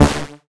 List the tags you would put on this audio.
Instrument samples > Synths / Electronic
bass additive-synthesis

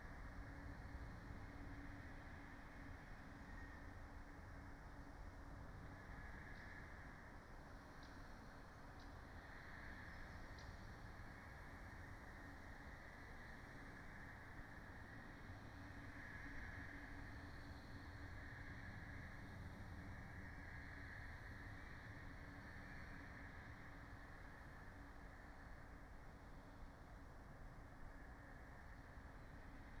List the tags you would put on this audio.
Soundscapes > Nature
Dendrophone nature alice-holt-forest artistic-intervention raspberry-pi weather-data soundscape data-to-sound field-recording modified-soundscape natural-soundscape sound-installation phenological-recording

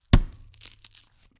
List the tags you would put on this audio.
Sound effects > Experimental
onion punch thud bones foley vegetable

